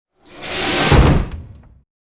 Sound effects > Other mechanisms, engines, machines
Big robot footstep 005
Big robot footstep SFX ,is perfect for cinematic uses,video games. Effects recorded from the field.
big, clang, clank, deep, footstep, futuristic, giant, heavy, impact, mechanical, metal, metallic, movement, resonant, robot, robotic, sci-fi, stomp, thud, walking